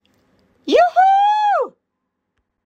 Speech > Solo speech
Female saying yoohoo :) My own voice, recorded with my iPhone.
Female, yoohoo